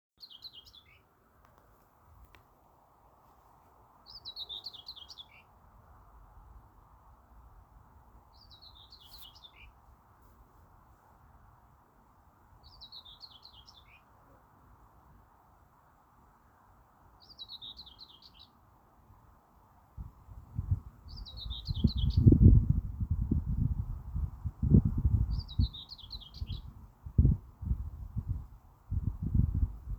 Soundscapes > Nature
Bird Song from the Chestnut Tree
Recording of a bird's song from beneath the chestnut tree where it was sitting. Later on you can catch the drone of an insect wing-beat. Recorded using a Samsung A52 phone app.
bird, birdcall, birdsong, bug, field-recording, fly, insect, nature, tree, wind